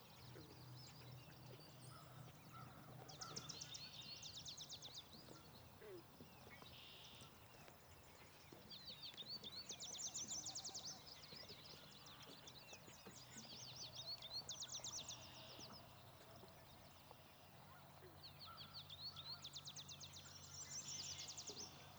Soundscapes > Nature
Birds in eastern Ontario
Taken in an Ontarian wetland. Tascam DR-60 RodeNTG3
nature, birds, aviary, bird, birdsong, field-recording